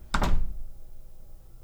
Sound effects > Objects / House appliances
Door Close 04
closing, slam, indoor, close, door, house